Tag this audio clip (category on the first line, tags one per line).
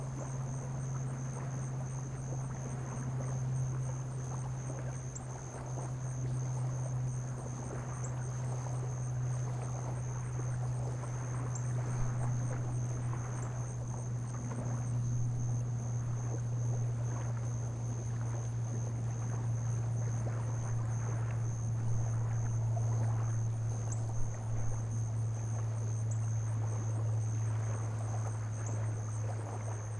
Soundscapes > Nature
lagoon
field-recording
wind
morning
waves